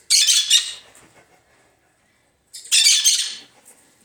Sound effects > Animals
Parrots - White-winged Parakeets
These are calls of the white-winged, or canary-winged parakeet, a parrot found in naturally the Amazon, and were recorded with an LG Stylus 2022 at Hope Ranch.
amazon, bird, exotic, jungle, parakeet, parrot, rainforest, tropical, zoo